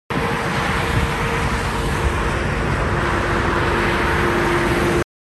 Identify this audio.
Sound effects > Vehicles
Sun Dec 21 2025 (15)
car, highway, road